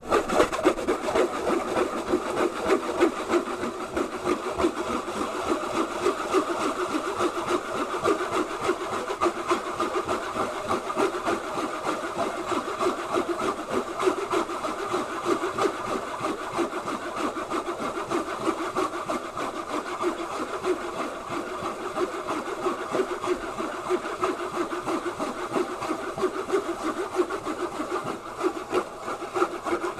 Sound effects > Objects / House appliances
TOONSwsh-Blue Snowball Microphone Twirling Swishes, Medium Slow Nicholas Judy TDC
Medium slow twirling swishes.
slow, twirl, Blue-Snowball, medium, Blue-brand, swish